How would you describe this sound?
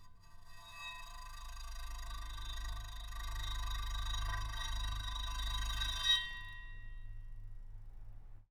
Sound effects > Other
Bowing metal newspaper holder with cello bow 5
atmospheric, bow, eerie, effect, fx, horror, metal, scary